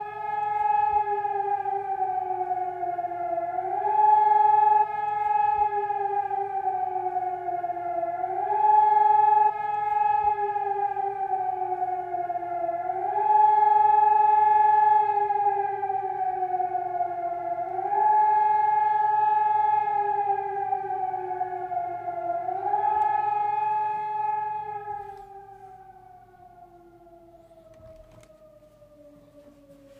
Soundscapes > Urban
alarm-sirens-germany-2510-01
Alarm sirens in a city in germany
alarm, alert, catastrophe, fieldrecording, germany, outdoor, sirens, war, warning